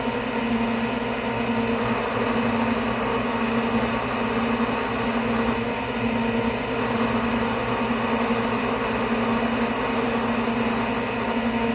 Sound effects > Electronic / Design
Datacluster clean looping Harddrive sounds [6x SAS]
This is the sound of a SuperMicro Superchasis with 6 SAS HDD's copying data. This sound can be used in various ways, such as as ambient sound for a computer system, retro system or a server farm if duplicated. The sound creates a clean loop. Recorded on S25U